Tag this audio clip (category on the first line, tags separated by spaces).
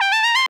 Sound effects > Electronic / Design
game audio arp videogame soundfx pluck